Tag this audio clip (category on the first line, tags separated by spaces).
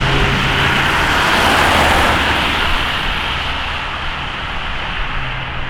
Sound effects > Vehicles
rainy field-recording automobile car drive vehicle